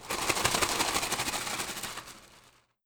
Sound effects > Animals
WINGBird-Blue Snowball Microphone, MCU Pigeon, Flap Away, Simulated Nicholas Judy TDC
Pigeon wings flapping away. Simulated
away, Blue-brand, Blue-Snowball, flap, foley, pigeon, simulation, wings